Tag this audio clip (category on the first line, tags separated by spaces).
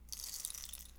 Sound effects > Objects / House appliances
stab industrial natural drill foley oneshot perc glass sfx hit metal clunk fieldrecording mechanical foundobject percussion bonk fx object